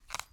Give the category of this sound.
Sound effects > Experimental